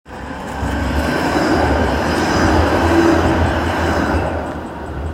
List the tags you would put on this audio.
Sound effects > Vehicles
city tram

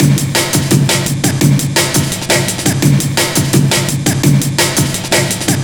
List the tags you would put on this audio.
Music > Other
drumloop drums

